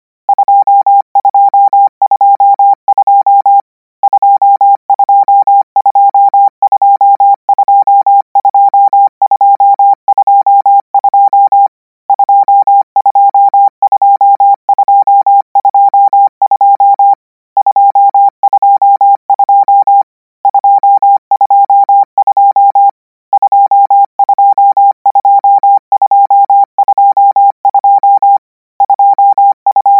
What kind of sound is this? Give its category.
Sound effects > Electronic / Design